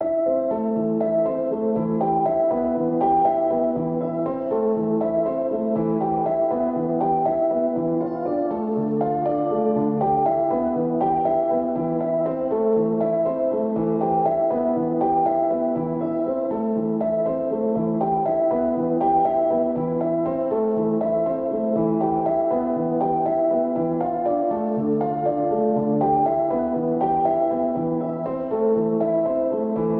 Music > Solo instrument
Piano loops 017 efect 4 octave long loop 120 bpm
120, simplesamples